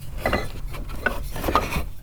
Music > Solo instrument
Sifting Through Loose Marimba Keys Notes Blocks 18
foley, thud, tink, loose, percussion, perc, block, wood, oneshotes, keys, fx, marimba, woodblock, notes, rustle